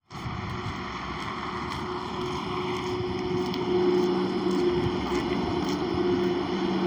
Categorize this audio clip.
Sound effects > Vehicles